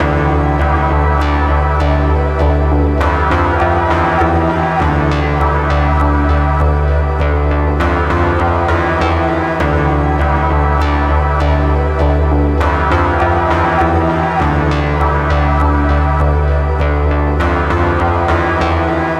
Music > Solo instrument
100 C SK1Polivoks 04
Melodic loops made with Polivoks and Casio SK1 analogue synths